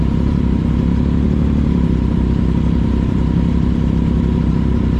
Sound effects > Other mechanisms, engines, machines
clip prätkä (3)
Description (Motorcycle) "Motorcycle Idling: distinctive clicking of desmodromic valves, moving pistons, rhythmic thumping exhaust. High-detail engine textures recorded from close proximity. Captured with a GoPro Hero 4 on the track at Alastaro.The motorcycle recorded was a Ducati Supersport 2019."
Motorcycle, Supersport